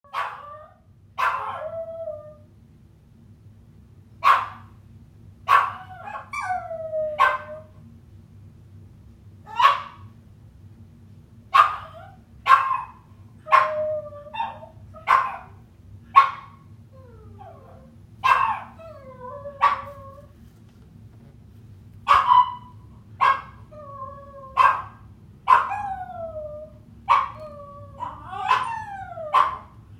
Sound effects > Animals
Dog Whining 4
This is the sound of a 10 year-old small, male Poodle/Chihuahua mix that is whining. Another dog can be heard sympathetically whining periodically.